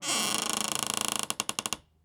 Sound effects > Objects / House appliances
wooden door creak10
A series of creaking sounds from some old door recorded with I don't even know what anymore.